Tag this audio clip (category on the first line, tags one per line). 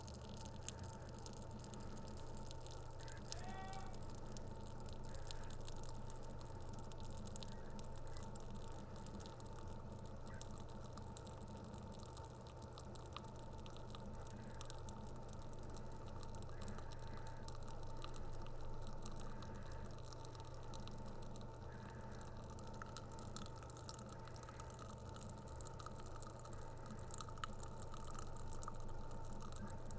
Sound effects > Natural elements and explosions
teardrops
drop
sweat
Blue-Snowball
cartoon
Blue-brand
water
mouth
drip
watering